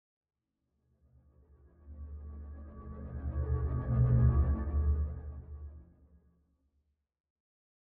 Sound effects > Experimental
Flying Car Fly By 2
Electric Eraser combined with an oscillating synth to create the sound of a futuristic car flying by